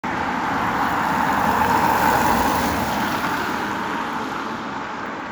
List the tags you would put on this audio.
Soundscapes > Urban
Car
Drive-by
field-recording

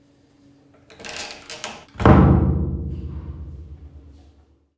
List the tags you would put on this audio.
Sound effects > Objects / House appliances
Creak
Door
Close

